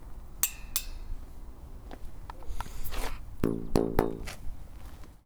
Sound effects > Objects / House appliances
Junkyard Foley and FX Percs (Metal, Clanks, Scrapes, Bangs, Scrap, and Machines) 116
FX, trash, Junk, Perc, Environment, Robotic, Clang, garbage, Machine, scrape, Junkyard, Metallic, Percussion, Bang, dumpster, SFX, rubbish, Bash, Foley, Smash, Ambience, Atmosphere, Clank, Robot, Dump, rattle, tube, dumping, Metal, waste